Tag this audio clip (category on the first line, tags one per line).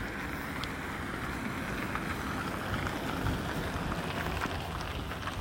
Soundscapes > Urban
car
tampere
vehicle